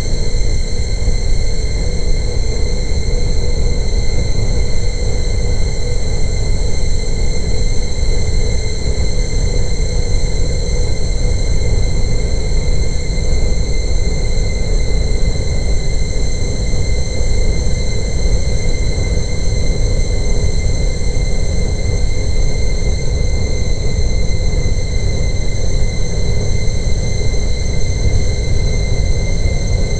Sound effects > Experimental
"After being stung and before falling asleep, I remember acutely regretting my choice to ever come here." For this audio clip, I recorded ambient noises in my home using a Zoom H4n multitrack recorder. I then molded and mutated those sounds (with Audacity) into what you hear in this final upload.
audacity, creepy, ragged, sinister, slow, suspense, swampy, tense, zoom-h4n